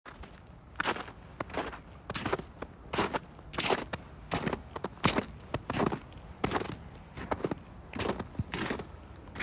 Sound effects > Human sounds and actions
Snow Walk 2
Boots walking through icey and soft snow.
footstep, footsteps, ice, snow, step, walk, walking, winter